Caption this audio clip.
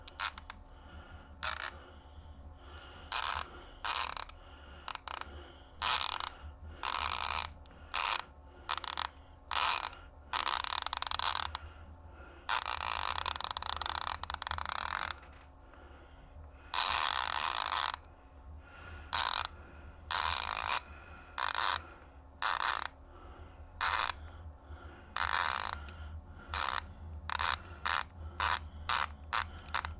Sound effects > Objects / House appliances
MECHRtch-Blue Snowball Microphone, CU Wrench Nicholas Judy TDC
Blue-brand, Blue-Snowball, foley, ratchet, wrench